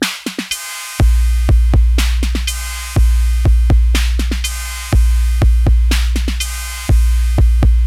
Music > Solo percussion
122 606Mod Loop 04
Sounds made using a Modified TR 606 Drum Machine
Electronic, Mod, Synth, Vintage, music, 606, Loop